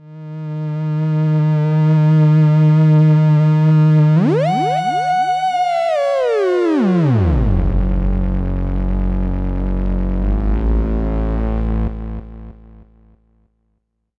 Sound effects > Experimental
Analog Bass, Sweeps, and FX-042
sci-fi, analogue, robotic, sample, retro, trippy, dark, sweep, bass, fx, analog, basses, robot, korg, alien, sfx, electronic, bassy, pad, oneshot, electro, snythesizer, scifi, mechanical, synth, machine, complex, effect, vintage, weird